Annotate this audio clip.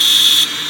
Sound effects > Vehicles
"Doors Closing" buzzer inside a bus.
alarm, bus, buzzer, doors-closing, warning